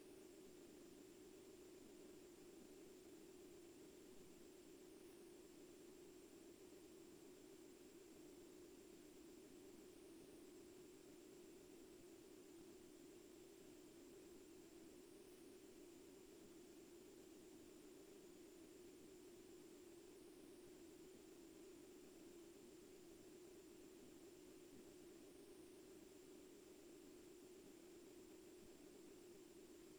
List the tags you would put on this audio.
Soundscapes > Nature
Dendrophone
raspberry-pi
soundscape
nature
weather-data
alice-holt-forest
modified-soundscape

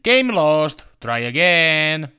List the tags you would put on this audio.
Speech > Solo speech
announcer
calm
male
man
videogames

